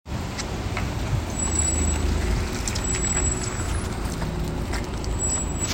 Soundscapes > Urban
Bus arriving 3 7
Where: Tampere Keskusta What: Sound of bus arriving at a bus stop Where: At a bus stop in the morning in a calm weather Method: Iphone 15 pro max voice recorder Purpose: Binary classification of sounds in an audio clip